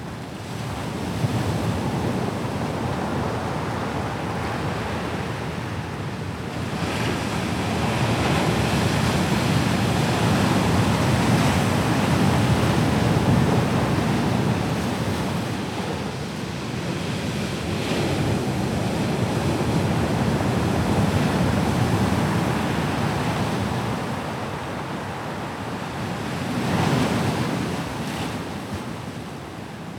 Soundscapes > Nature
Ambiance Ocean Praia dos Moinhos Loop Stereo 01
Ocean - Close/Medium Recording - Loop Recorded at Praia dos Moinhos, São Miguel. Gear: Sony PCM D100.
ambience, azores, beach, coastal, environmental, fieldrecording, foam, loop, marine, natural, nature, ocean, portugal, praiadosmoinhos, relaxation, sand, saomiguel, sea, seaside, shore, soundscape, stereo, surf, water, waves, wind